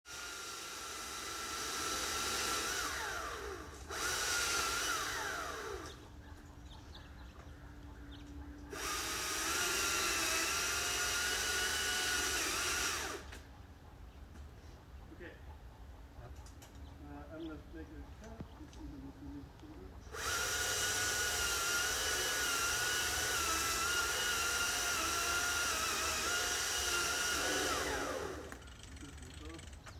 Soundscapes > Other
Electric chainsaw, large pine 04/26/2023
Cutting tree with electric chain saw
tree-work, electric, chainsaw, cutting